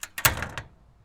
Sound effects > Objects / House appliances
Outdoor fence closing. Recorded from a Zoom H1n